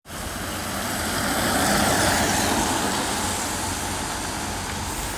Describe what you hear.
Sound effects > Vehicles
car rain 05
car, engine, rain, vehicle